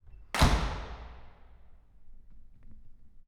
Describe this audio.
Sound effects > Objects / House appliances
Building door closing
This is the main door for our apartment building opening and closing. It's opened using a code.
doors, code, closing, opening, gate, door, main